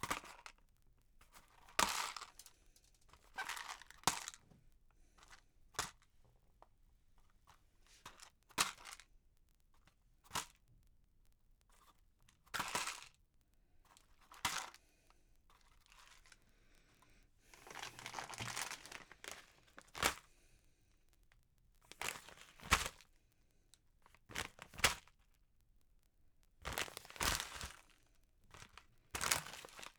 Sound effects > Human sounds and actions
Dropping groceries plastic packet into a supermarket basket Humdrum
Dropping groceries plastic packet into a supermarket basket
basket, supermarket, grocery, groceries